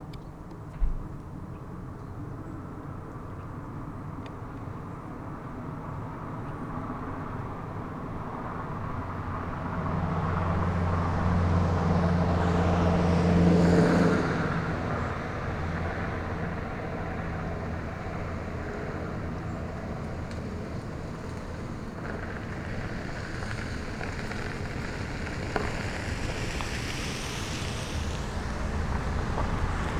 Soundscapes > Urban
Where I live, we're close to nature. The magnificent soundscape that's in reach is appealing and unique. The downside of this situation is that the local population is concentrated along one central road that follows the coast. Most times, it's almost impossible to record nature without the rumble of a distant car. This recording is just a raw representation of the noise pollution. The first vehicle is on a faster road more distant than the road I'm standing on. A second vehicle is passing on the dirt road I'm on. The third vehicle is on the same road as the first one. Tascam DR-60 RodeNTG3